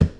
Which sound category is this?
Sound effects > Objects / House appliances